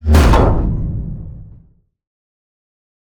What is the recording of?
Sound effects > Other
Sound Design Elements Impact SFX PS 044
crash, cinematic, collision, sharp, game, power, design, force, audio, rumble, smash, hard, thudbang, sfx, impact, effects, sound, hit, transient, strike, heavy, blunt, shockwave, percussive, explosion